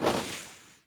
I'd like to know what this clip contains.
Sound effects > Other
Sound for magic or something else